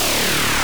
Sound effects > Electronic / Design
made in openmpt